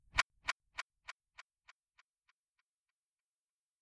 Sound effects > Other mechanisms, engines, machines
One of multiple variations. Meant to work as a slow down/ rewind effect.